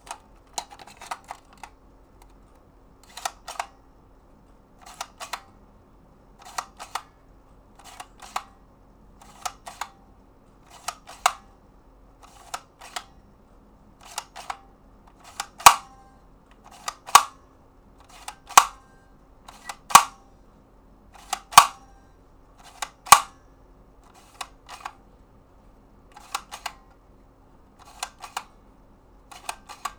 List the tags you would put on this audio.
Sound effects > Objects / House appliances
Blue-brand
insert
toy
Blue-Snowball
pull
remove
lever
reel
foley
view-master

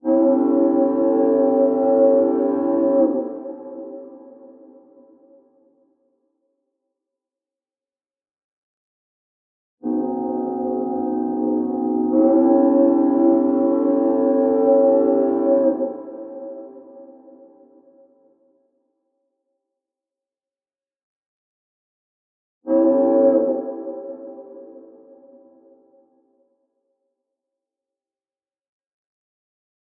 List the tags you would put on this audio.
Sound effects > Vehicles
air
away
blow
chime
distant
isolated
locomotive
railroad
santa-fe
steam
train
whistle

